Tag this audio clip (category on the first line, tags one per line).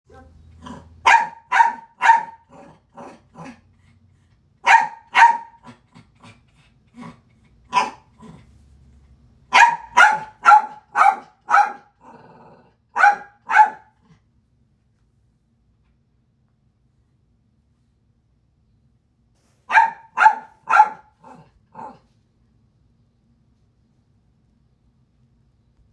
Sound effects > Animals
growl
growling
pet
bark
barking
animal
dog